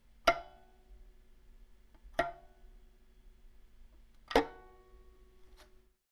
Instrument samples > String
Plucking broken violin string 8
strings, string, horror, unsettling, violin, creepy, beatup, pluck